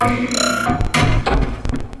Music > Solo percussion
Industrial Estate 12
chaos, industrial